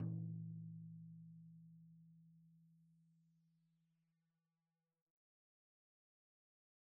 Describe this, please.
Music > Solo percussion
Med-low Tom - Oneshot 36 12 inch Sonor Force 3007 Maple Rack
acoustic, beat, drum, drumkit, drums, flam, kit, loop, maple, Medium-Tom, med-tom, oneshot, perc, percussion, quality, real, realdrum, recording, roll, Tom, tomdrum, toms, wood